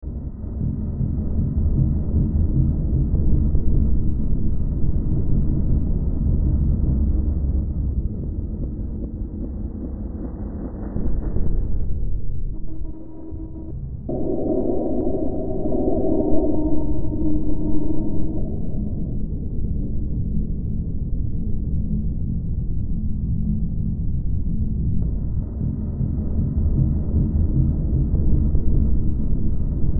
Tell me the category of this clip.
Soundscapes > Synthetic / Artificial